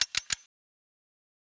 Sound effects > Other mechanisms, engines, machines
Ratchet strap-4
Ratchet strap cranking
clicking, crank, machine, machinery, mechanical, ratchet, strap